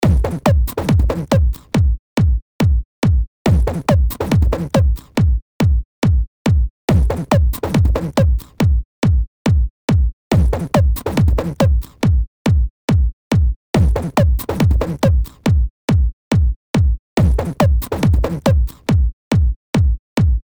Music > Solo percussion
Ableton Live. VST....Purity....Drums 140 Bpm Free Music Slap House Dance EDM Loop Electro Clap Drums Kick Drum Snare Bass Dance Club Psytrance Drumroll Trance Sample .